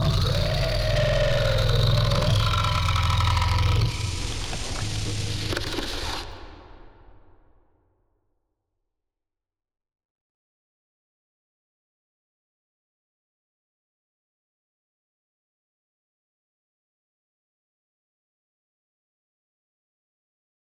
Sound effects > Experimental
Creature Monster Alien Vocal FX-20
gutteral, Snarl, Groan, Monstrous, Sounddesign, Monster, fx, scary, Vocal, Otherworldly, Snarling, Deep, Sound, visceral, Vox, devil, evil, Reverberating, Frightening, demon, Ominous, sfx, Growl, gamedesign, Creature, Echo, Alien, Fantasy, boss, Animal